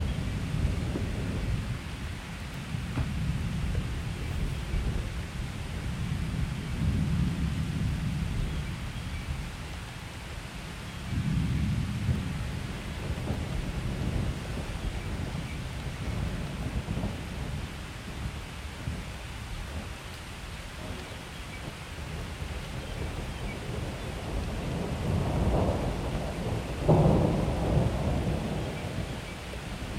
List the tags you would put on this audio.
Soundscapes > Nature
rain
thunder
weather

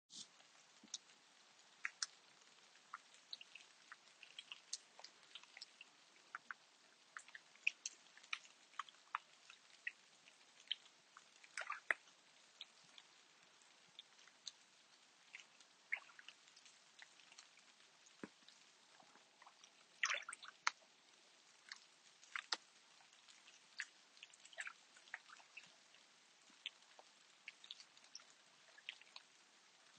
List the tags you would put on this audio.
Soundscapes > Nature
drops
bathing
drip
water

